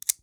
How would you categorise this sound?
Sound effects > Electronic / Design